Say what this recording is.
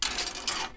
Sound effects > Other mechanisms, engines, machines
metal shop foley -099
pop, percussion, bang, bam, sfx, oneshot, boom, rustle, thud, sound, foley